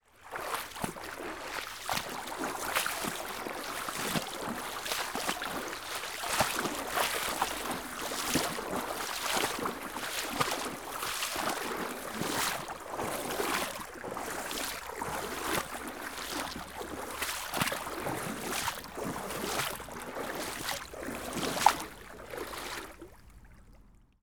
Soundscapes > Nature
A recording of me wading through water knee deep.